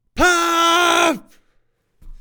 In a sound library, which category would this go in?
Speech > Solo speech